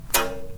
Sound effects > Other mechanisms, engines, machines
Handsaw Pitched Tone Twang Metal Foley 37
foley, fx, handsaw, hit, household, metal, metallic, perc, percussion, plank, saw, sfx, shop, smack, tool, twang, twangy, vibe, vibration